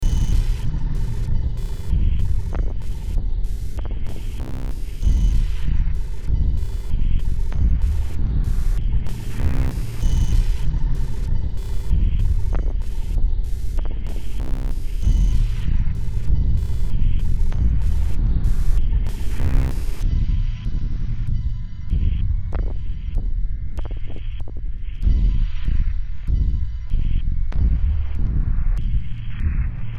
Music > Multiple instruments
Demo Track #3639 (Industraumatic)
Ambient, Cyberpunk, Games, Horror, Noise, Sci-fi, Soundtrack